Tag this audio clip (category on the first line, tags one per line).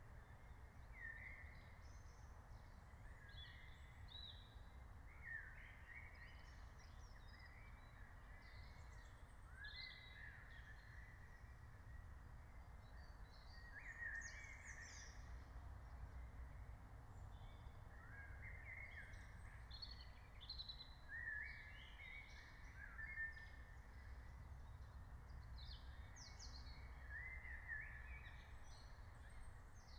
Soundscapes > Nature
raspberry-pi
natural-soundscape
alice-holt-forest
nature
soundscape
phenological-recording
meadow
field-recording